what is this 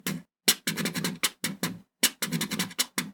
Music > Solo percussion
A short sample from a home beatbox track, it was mainly for testing a midi converter but why not share